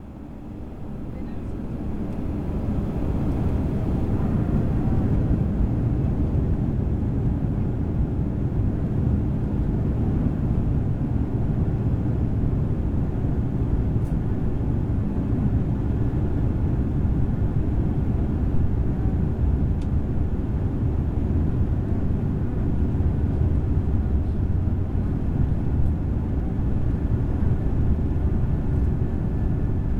Soundscapes > Indoors
Airplane rolling and take off from the passenger seat
The sound of the airplane rolling and taking off. You can also hear the sound of the airplane retracting the wheels at #0:37 and the flaps at #1:48 Recorded with Tascam Portacapture X6